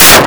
Sound effects > Experimental
Pixel Hit
I sound I made some time ago. I simply blew into the microphone and made this noise. Nothing more to it.
Bang, Bit-Crushed, Boom, Hit